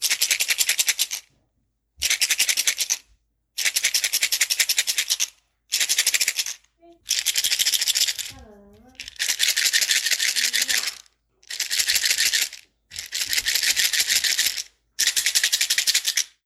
Sound effects > Objects / House appliances
TOONShake-Samsung Galaxy Smartphone, CU Pill Bottle 03 Nicholas Judy TDC
A pill bottle shaking.